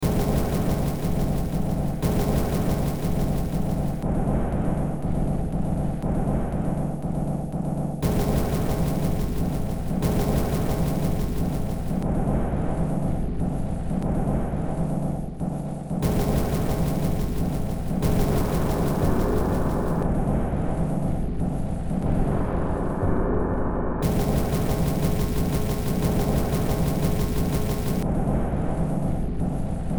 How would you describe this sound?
Music > Multiple instruments
Short Track #3047 (Industraumatic)
Ambient, Cyberpunk, Games, Horror, Industrial, Noise, Sci-fi, Soundtrack, Underground